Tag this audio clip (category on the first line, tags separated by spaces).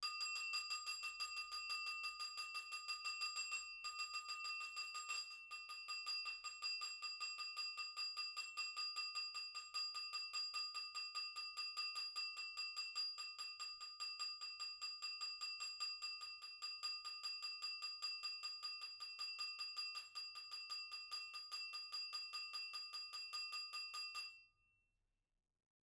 Sound effects > Other
glass solo-crowd Rode XY individual NT5 FR-AV2